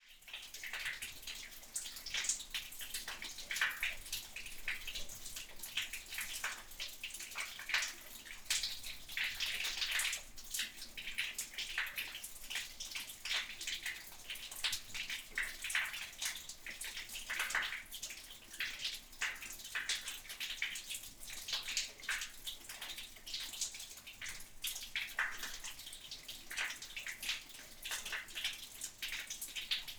Sound effects > Natural elements and explosions
WATRDran Stormwater drip
Water dripping into a storwater drain. Processed in iZotope to remove noise and set levels.
babbling, drain, drip, dripping, echo, flow, flowing, liquid, pipe, running, sfx, splash, stormwater, trickle, underground, water